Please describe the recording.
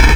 Instrument samples > Percussion
aliendrum aliendrums alienware exoalien non-Mexican-alien UFOs-don't-speak-Spanish snare fake whoosh rush blast breeze burst dart dash flap flash fly flutter gale gasp gust hurry roar shoot sigh sough sprint swish whiz zoom aliensnare fakecrash junk spaceship spaceshit fakery junkware weird uncanny eerie unnatural preternatural supernatural unearthly other-worldly unreal ghostly mysterious mystifying strange abnormal unusual eldritch creepy spooky freaky rum odd bizarre peculiar quirky surreal atypical unorthodox unconventional extraordinary uncommon outlandish